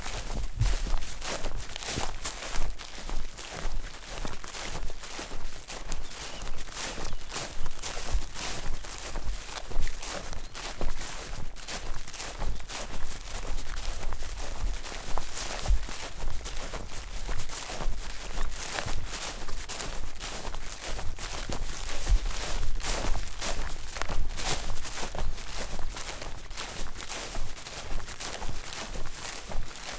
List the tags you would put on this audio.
Sound effects > Human sounds and actions
field foot-steps footsteps FR-AV2 glass NT5 Rode Tascam walking

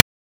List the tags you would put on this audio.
Instrument samples > Percussion
Click Transient Crispy brazilianfunk Distorted

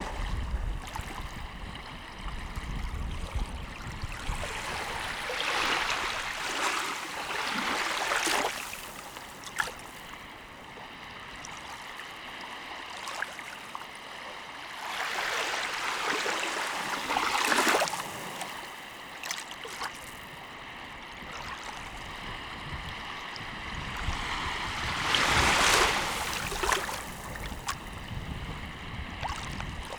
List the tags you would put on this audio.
Soundscapes > Nature
nature shore

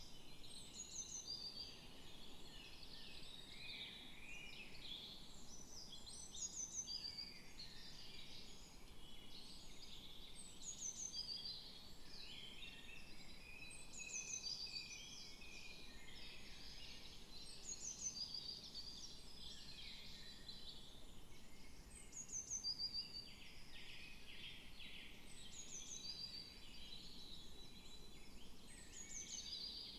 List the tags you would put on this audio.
Soundscapes > Nature
alice-holt-forest soundscape natural-soundscape artistic-intervention nature sound-installation modified-soundscape raspberry-pi phenological-recording data-to-sound weather-data field-recording Dendrophone

